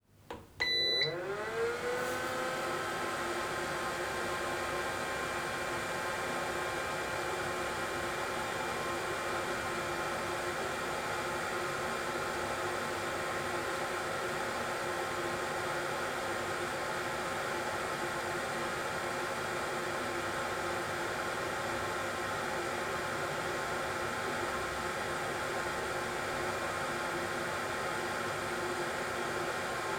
Sound effects > Objects / House appliances
Japanese-style toilet seat drying function
This is the drying function recorded from a Japanese-style toilet seat (bidet).
seat, Japanese, drying, style, toilet, bidet